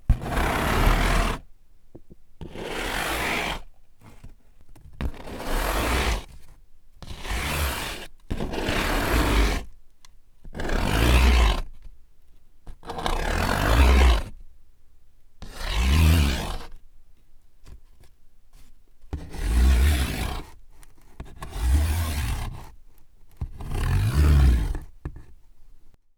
Sound effects > Objects / House appliances
Subject : A recording made for Friction series of dare, Dare2025-10 "Cardboard / Paper". Made by rubbing a cardboard box with my fingernails. Date YMD : 2025 June 21 Location : France. Hardware : Zoom H5 XY. Flimsy recording setup on pillow or something. Weather : Processing : Trimmed in Audacity probably some slicing. Normalised.